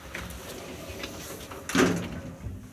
Sound effects > Other mechanisms, engines, machines
Elevator doors closing. Recorded with my phone.
closing, elevator, close, lift, slide, doors